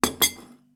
Sound effects > Objects / House appliances
Plate place
Authentic recording of a ceramic plate making contact with a hard surface, captured cleanly for precise foley work. This is a demo from the full "Apartment Foley Sound Pack Vol. 1", which contains 60 core sounds and over 300 variations. Perfect for any project genre.